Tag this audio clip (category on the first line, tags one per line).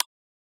Sound effects > Objects / House appliances
Button
Calculator
Texas-instruments